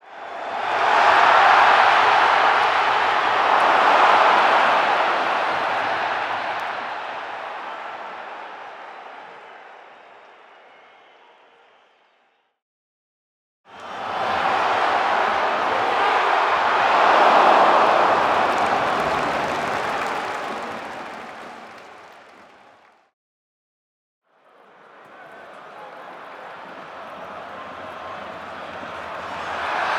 Soundscapes > Other
CRWDReac-SOCCER Millerntor Stadium Crowd Reaction Chance Missed 01 PHILIPP FEIT FCSP 29.546 Sound Of Sankt Pauli
Several authentic live recording from FC St. Pauli’s Millerntor Stadium, capturing the collective reaction of 29,546 fans to a narrowly missed scoring opportunity.
Football, Missed, Soccer, Chance, Reaction, Crowd, SanktPauli, Stadium